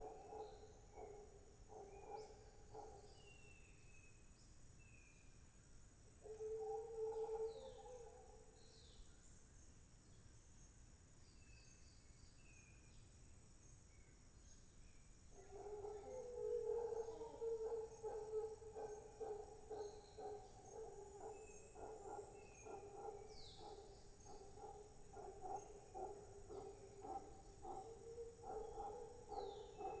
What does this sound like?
Soundscapes > Nature
howler monkeys and birds ambience in Corcovado National Park
Soundscape of a birds and howler monkeys in Corcovado National Park of Costa Rica.
jungle, howler, corcovado, monkeys